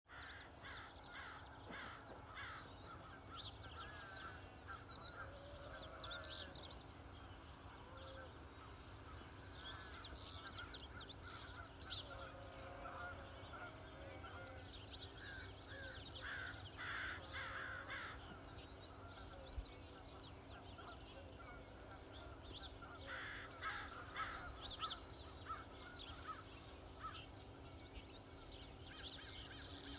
Soundscapes > Nature
Sunday spring morning 03/06/2022
Sunday morning ambience on farm